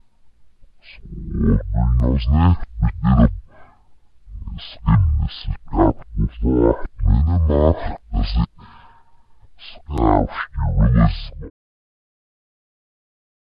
Speech > Processed / Synthetic
Deep monster voice
Low pitch and slowed down human voice that sounds kind of like a monster or Jabba The Hutt, whichever one works for you. I have permission from the voice performer to process the original recording and distribute it publicly.
animal, bass, creepy, dark, eerie, horror, monster